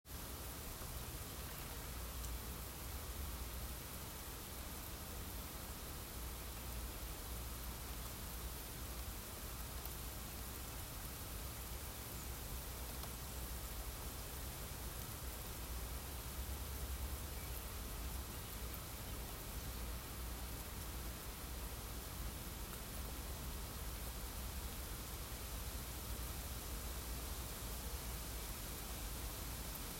Soundscapes > Nature
Light Rain - June 2025
Recorded with an iPhone XR. Different day, same phone.
birds, field-recording, nature, rain, storm, weather, wind